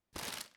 Sound effects > Objects / House appliances
Sound used originally for the action of picking up a paper bag. Recorded on a Zoom H1n & Edited on Logic Pro.
Crunch, Foley, PaperBag, Rustle